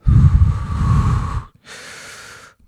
Speech > Solo speech
(Rubbish) Deep exhale in mic
Subject : Mid 20s male, exhaling deeply into the microphone. Date YMD : 2025 June 14 Location : Albi 81000 Tarn Occitanie France. Hardware : Tascam FR-AV2, Shure SM57 with A2WS windcover Weather : Processing : Trimmed in Audacity.